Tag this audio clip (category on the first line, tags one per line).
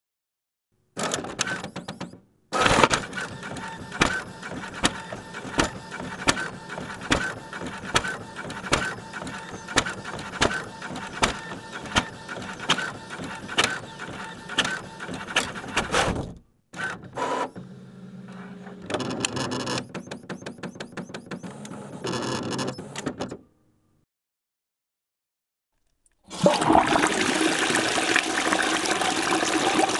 Sound effects > Other
Olympus
Test
VP-20